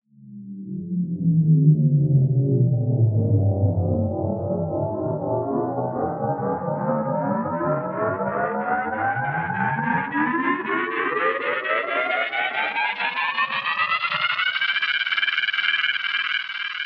Sound effects > Electronic / Design
Whale/Aquatic Riser/Powerup - Variation 2
Made in LMMS and 3xOsc using a sh** ton of effects.
Aquatic, FX, Powerup, Riser, Sweep, Underwater, Whale